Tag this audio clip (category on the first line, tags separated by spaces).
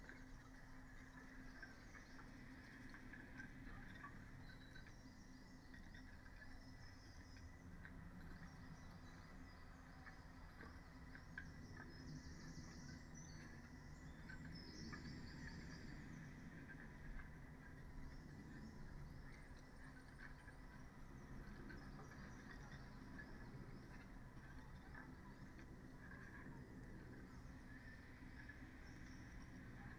Soundscapes > Nature
raspberry-pi artistic-intervention field-recording weather-data phenological-recording data-to-sound alice-holt-forest nature modified-soundscape sound-installation Dendrophone natural-soundscape soundscape